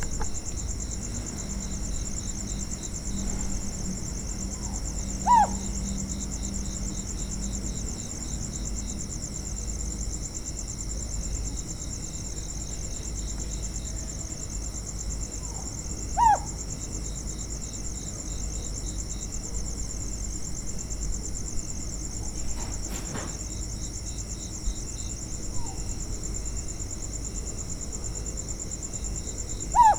Soundscapes > Nature
Chim Đêm - Night Birds 2025.12.08 21:22
Night and 2 birds (1 bird very close in bannana tree about 1 meter and other bird far). Record use Zoom H4n Pro 2025.12.08 21:22
nature, night